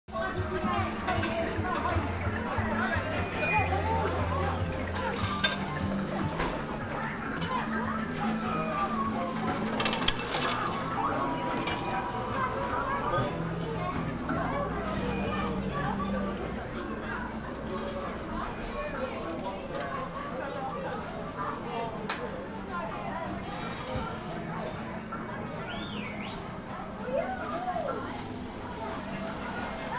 Soundscapes > Indoors
Seaside Penny Arcade Atmos
Field recording of the interior of a seaside end-of-the-pier slot machine amusement arcade.
ambience, amusements, arcade, atmos, field-recording, game, games, penny-pusher, pier, seaside, slot-machines